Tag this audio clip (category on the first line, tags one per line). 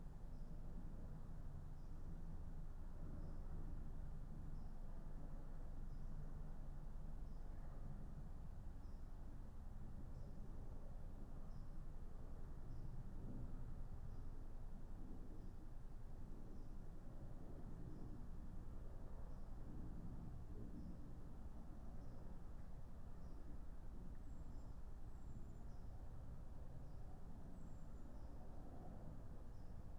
Soundscapes > Nature
data-to-sound
field-recording
soundscape
artistic-intervention
weather-data
modified-soundscape
Dendrophone
alice-holt-forest
nature
natural-soundscape
raspberry-pi
phenological-recording
sound-installation